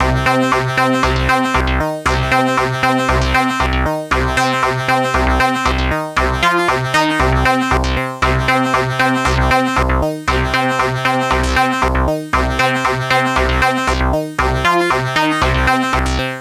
Music > Solo instrument
117 D# Polivoks Brute 08
Melody, Synth, Vintage, Texture, Polivoks, Loop, Analogue, Brute, Analog, Soviet, Casio, Electronic, 80s